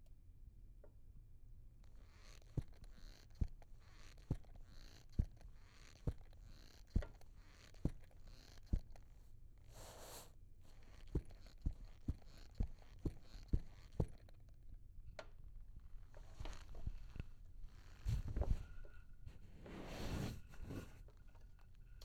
Sound effects > Human sounds and actions
Squeaky boots walking
Created by recording actual doc martins being manipulated (i.e. stomped or placed at varying intensity and also in different ways). Stomping on carpet as well as cardboard. Captured on SM58.
boots, foley, squeak, walking